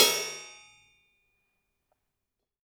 Music > Solo instrument
Cymbal Grab Stop Mute-011
Crash, Custom, Cymbal, Cymbals, Drum, Drums, FX, GONG, Hat, Kit, Metal, Oneshot, Paiste, Perc, Percussion, Ride, Sabian